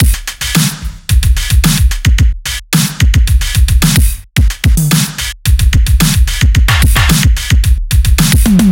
Music > Solo percussion
80's Style Retro Sampler Beat
Retro style banging drum beat loop chopped up and cooked with a sampler.